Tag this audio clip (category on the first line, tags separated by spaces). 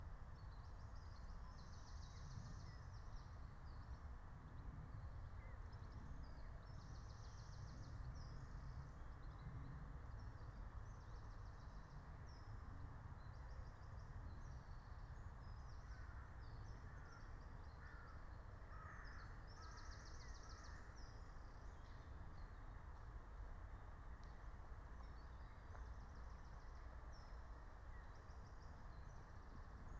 Soundscapes > Nature
alice-holt-forest field-recording natural-soundscape phenological-recording raspberry-pi soundscape